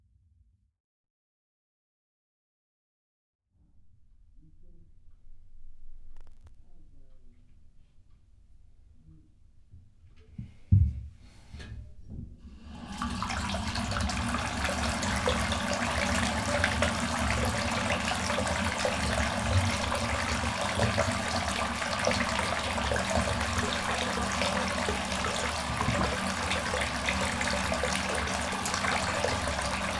Sound effects > Objects / House appliances
Runnning bath
the bath at home running
bath, bubbles, running, warm, water